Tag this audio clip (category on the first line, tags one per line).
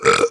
Sound effects > Human sounds and actions
Body
Human
Sound
Strange